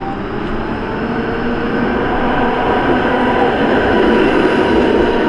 Sound effects > Vehicles
Tram00045768TramPassing
Audio of a tram passing nearby. Recording was taken during winter. Recorded at Tampere, Hervanta. The recording was done using the Rode VideoMic.
transportation, winter, tram, field-recording, vehicle, tramway, city